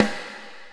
Music > Solo percussion
Snare Processed - Oneshot 76 - 14 by 6.5 inch Brass Ludwig
acoustic, beat, brass, flam, fx, hit, kit, oneshot, realdrum, realdrums, rim, rimshot, sfx, snares